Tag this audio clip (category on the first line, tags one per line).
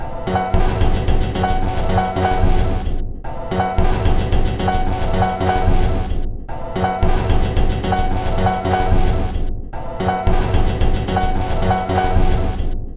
Instrument samples > Percussion
Samples
Packs
Industrial
Alien
Underground
Weird
Soundtrack
Ambient
Loop
Loopable
Drum
Dark